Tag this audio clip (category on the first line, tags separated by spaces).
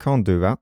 Speech > Solo speech
2025 Adult Calm cant-do-that FR-AV2 Generic-lines Hypercardioid july Male mid-20s MKE-600 MKE600 Sennheiser Shotgun-mic Shotgun-microphone Single-mic-mono Tascam VA Voice-acting